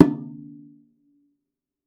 Music > Solo instrument
High Tom Sonor Force 3007-001
Drum, oneshot, Drums, low, Drumkit, toms, kick, perc